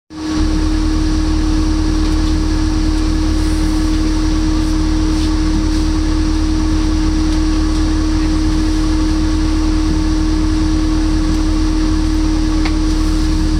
Sound effects > Vehicles
2005 New Flyer D40LF bus idling #2 (MiWay 0554)
I recorded the idling engine of a Mississauga Transit/MiWay bus. This is a recording of a 2005 New Flyer D40LF transit bus, equipped with a Cummins ISL I6 diesel engine and Voith D864.3E 4-speed automatic transmission. This bus was retired from service in 2023.
engine, isl, miway, d40lf, bus, flyer, 2005, cummins, transit, d40lfr, public, transmission, voith, truck, idle, new, transportation, mississauga, d40